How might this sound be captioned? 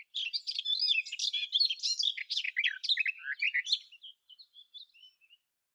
Sound effects > Animals
A recording of a Garden Warbler. Edited using RX11.